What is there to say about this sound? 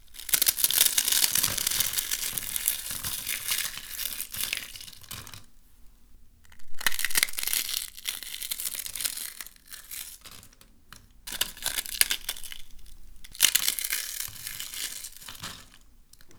Sound effects > Objects / House appliances
Chips crunching
A pile of chips (or other crunchy objects) being crunched and pressed on